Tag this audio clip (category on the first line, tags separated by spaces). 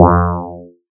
Instrument samples > Synths / Electronic
additive-synthesis fm-synthesis bass